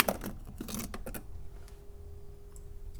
Sound effects > Other mechanisms, engines, machines
Woodshop Foley-009
bam, bang, boom, bop, crackle, foley, fx, knock, little, metal, oneshot, perc, percussion, pop, rustle, sfx, shop, sound, strike, thud, tink, tools, wood